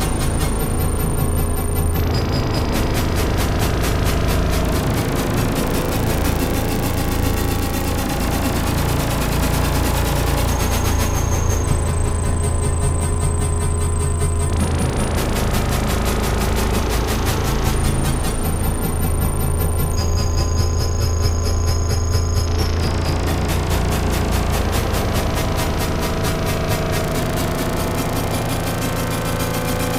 Soundscapes > Synthetic / Artificial
Logic Immense – Random FM Modulation with Granular Synthesis on Top
FM Modulation: Random Sampler is generating jittered pulses (t2) and corresponding notes (X2). An LFO is used to generate a bunch of waves which are randomly selected to perform FM modulation over a VCO. The output is copied and panned left and right slowly and at different frequencies to create the stereo field. Plateau is the used for reverb and a bit of delay. Granular Synthesis: A copy of the panned output is fed to the texture synthesizer which is used as granular engine. Density is not so much and the size is small. Some parameters are slightly randomized. Everything is then summed together in the mixer.